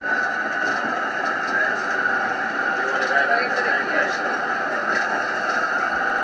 Sound effects > Vehicles
tram sounds emmanuel 16
tram, 23